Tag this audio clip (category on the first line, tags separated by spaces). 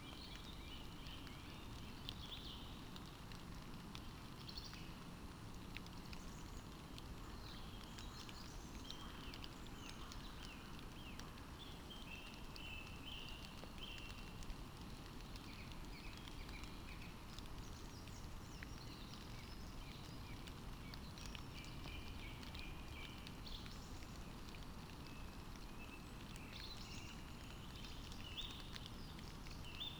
Soundscapes > Nature
raspberry-pi weather-data artistic-intervention sound-installation nature field-recording phenological-recording data-to-sound soundscape modified-soundscape Dendrophone alice-holt-forest natural-soundscape